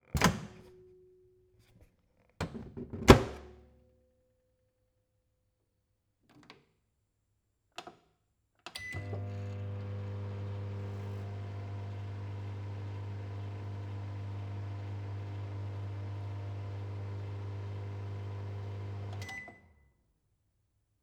Sound effects > Objects / House appliances
Sennheiser MKE600 P48, no filter. Weather : Processing : Trimmed in Audacity. Notes : Microwave was empty.
beep, buzz, buzzing, close, closing, dial, door, FR-AV2, Hypercardioid, micro-wave, microwave, MKE-600, MKE600, open, opening, rotary-dial, Sennheiser, Shotgun-mic, Shotgun-microphone, Single-mic-mono, Tascam, turned-on